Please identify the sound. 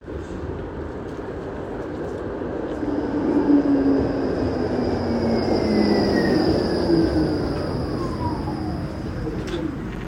Sound effects > Vehicles
Tram sound
Tram arrival and departure sequences including door chimes and wheel squeal. Wet city acoustics with light rain and passing cars. Recorded at Sammonaukio (17:00-18:00) using iPhone 15 Pro onboard mics. No post-processing applied.
15, city, iPhone, light, mics, Pro, rain, Tram